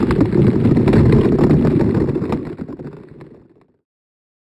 Sound effects > Electronic / Design
A Deep Ice Cracking inside of ice Glaciers designed with Pigments via studio One